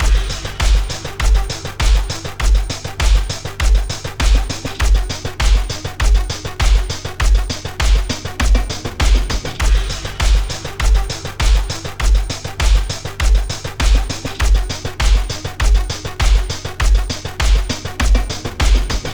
Music > Multiple instruments
trippy bass beat
Heavy hitting funk beat with scratchy loopy synth melody. Features heavily modified samples from PreSonus loop pack included in Studio One 6 Artist Edition
bass, funk, jungle, melody